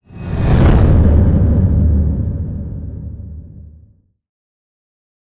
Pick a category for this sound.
Sound effects > Other